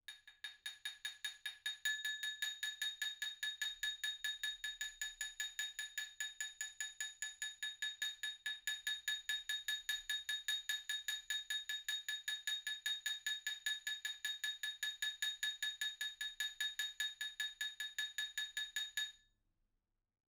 Sound effects > Other
Glass applause 23
Tascam, wine-glass, XY